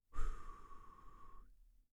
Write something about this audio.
Sound effects > Human sounds and actions
shot blow long 2
It's a sound created for the game Dungeons and Bubbles for The Global Game Jam 2025.